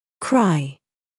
Speech > Solo speech
to buy
voice, english, word, pronunciation